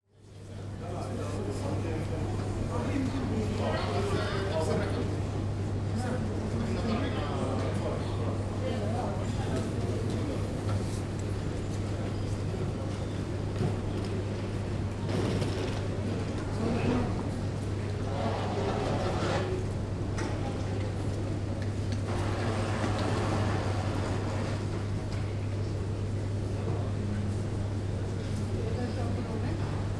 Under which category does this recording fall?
Soundscapes > Urban